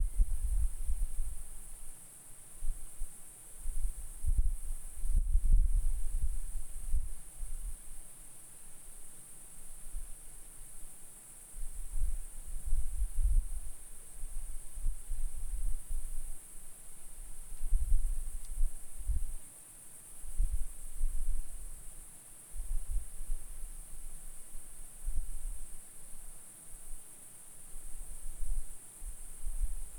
Soundscapes > Nature
Night Cicada Kazakhstan Insects
Night Cicada in Kazakhstan. Recorded stereo on zoom H5 2024 Year. Summer.